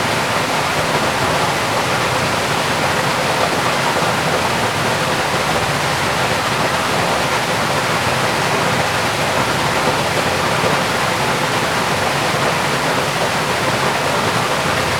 Soundscapes > Nature
Ambiance Old Mill Ribeira dos Caldeirões Loop Stereo 02
Old Mill - Close Recording - Loop Recorded at Ribeira dos Caldeirões, São Miguel. Gear: Sony PCM D100.